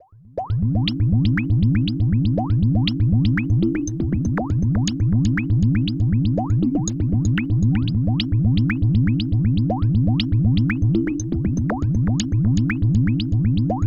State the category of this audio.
Sound effects > Electronic / Design